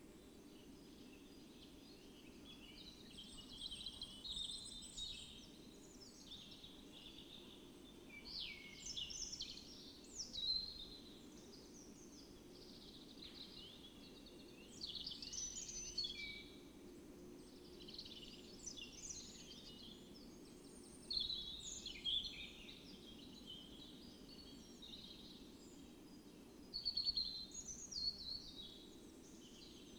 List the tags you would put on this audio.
Soundscapes > Nature
alice-holt-forest
field-recording
weather-data
artistic-intervention
phenological-recording
natural-soundscape
raspberry-pi
soundscape
nature

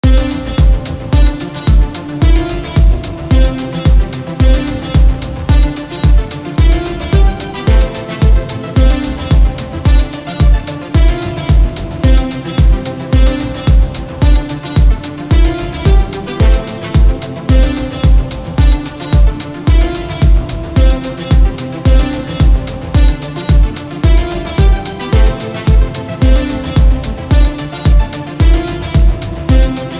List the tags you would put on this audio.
Music > Multiple instruments
Musical
Synth
Bass
Closed
Composition
Drums
Drum
Sample
Hi-Hats
Snare

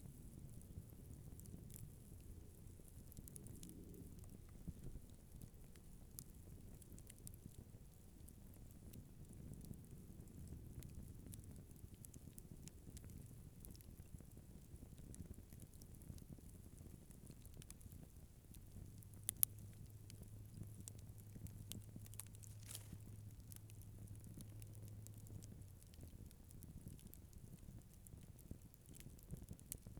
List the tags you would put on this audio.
Soundscapes > Nature
burning
crackle
fire

